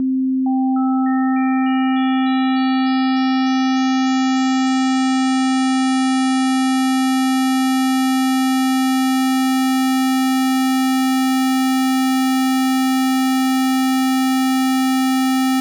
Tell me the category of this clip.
Sound effects > Experimental